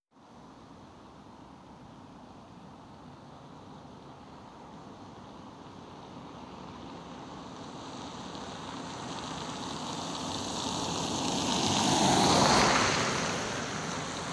Sound effects > Vehicles
tampere car22
automobile, car, vechicle